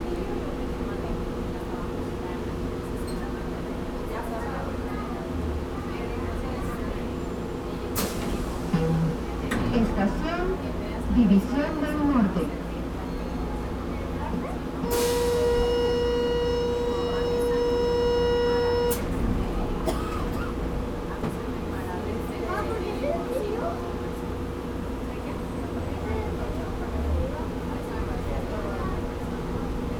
Soundscapes > Urban
Public address system announcing arrival to División del Norte train station in Guadalajara, México.